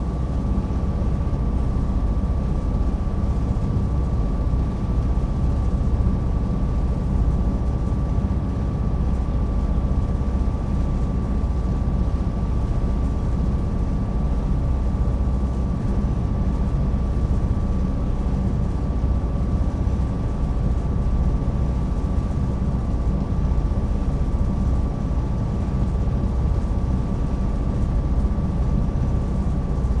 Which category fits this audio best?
Soundscapes > Indoors